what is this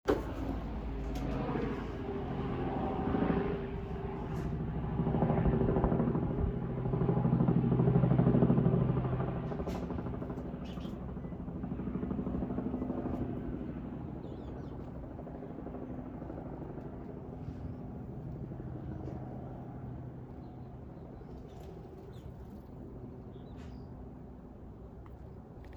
Soundscapes > Urban
Helicopter over Backyard
backyard, birds, field-recording, helicopter, summer